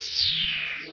Soundscapes > Synthetic / Artificial
LFO Birdsong 5
Birdsong, LFO, massive